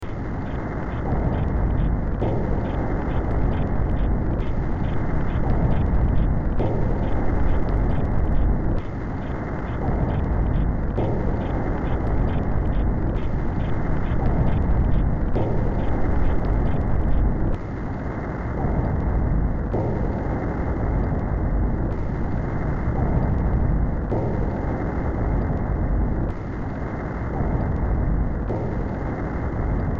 Music > Multiple instruments
Demo Track #3448 (Industraumatic)
Sci-fi, Games, Noise, Ambient, Underground, Cyberpunk, Industrial, Soundtrack